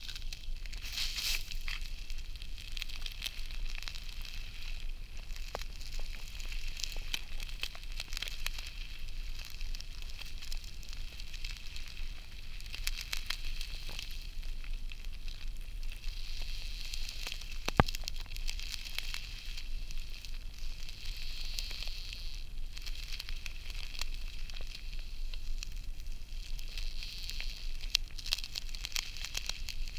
Sound effects > Natural elements and explosions
under the ice, lake ontario, hanlan's beach, canada
Recorded with a stereo hydrophone and a zoom h6. recording of winter melt underwater through a crack in the ice.